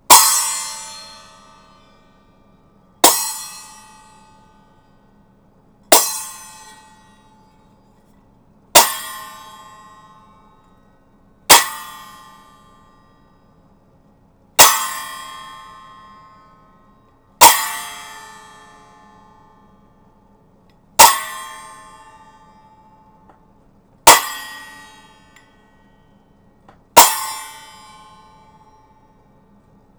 Music > Solo percussion
6'' hand cymbals crash together.